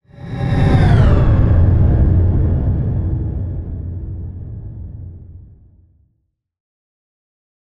Sound effects > Other
Sound Design Elements Whoosh SFX 038
audio, effect, film, trailer